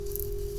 Sound effects > Other mechanisms, engines, machines
Woodshop Foley-021
fx, rustle, boom, oneshot, thud, sfx, wood, little, knock, shop, foley, bam, metal, tink, pop, sound, perc, bop, tools, percussion, strike, bang, crackle